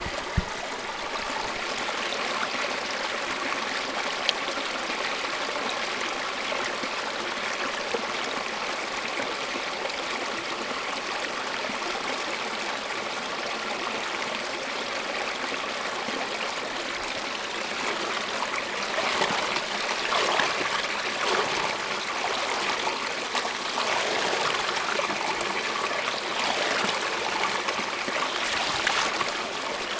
Sound effects > Natural elements and explosions
Sound of a New Hampshire river flowing
stream, brook, river, water